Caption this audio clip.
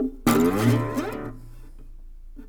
Music > Solo instrument
acoustic guitar slide20
acosutic, chord, chords, dissonant, guitar, instrument, knock, pretty, riff, slap, solo, string, strings, twang